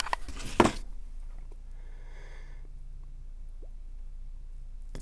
Sound effects > Other mechanisms, engines, machines
shop foley-012
sound, oneshot, percussion, tools, metal, boom, strike, sfx, little, tink, fx, foley, rustle, bang, pop, wood, crackle, knock, shop, bop, thud, bam, perc